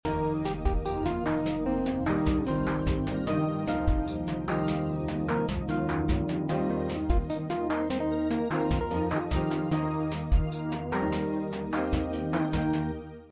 Music > Other
I created it using BeepBox, a browser-based music tool that lets you sequence melodies and rhythms. I composed the notes, arrangement, and structure myself using its built-in synth sounds.